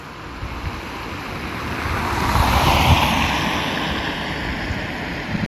Soundscapes > Urban
auto20 copy
car, traffic